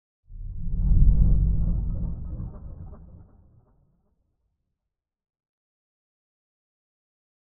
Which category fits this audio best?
Sound effects > Electronic / Design